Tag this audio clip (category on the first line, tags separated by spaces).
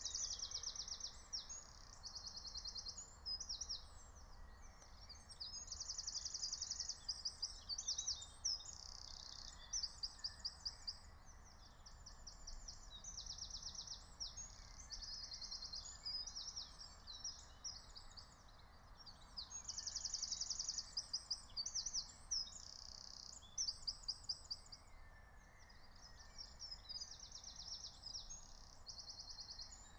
Soundscapes > Nature
meadow soundscape alice-holt-forest phenological-recording nature natural-soundscape field-recording